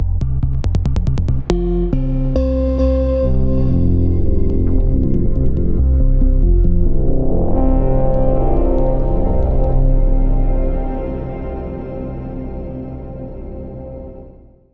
Instrument samples > Synths / Electronic
bass stabs drops bassdrop sub subs subbass subwoofer low lowend clear wobble lfo wavetable synthbass synth